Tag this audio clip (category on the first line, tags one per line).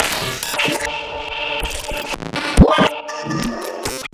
Sound effects > Experimental
alien
crack
edm
experimental
idm
impacts
laser
lazer
percussion
snap
zap